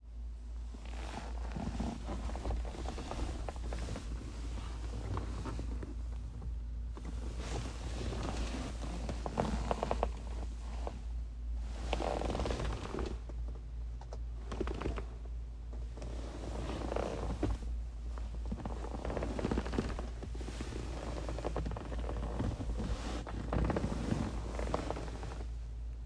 Sound effects > Vehicles
Leather Car Seat 1
Leather seat in a Jeep Wrangler Sahara. Sound of someone sitting in the chair, and movement in the chair.
chair, seat, leather